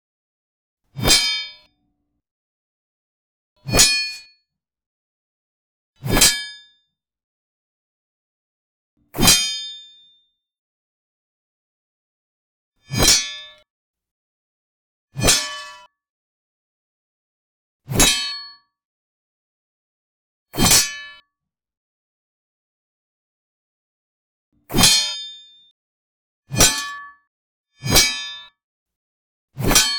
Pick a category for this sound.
Sound effects > Objects / House appliances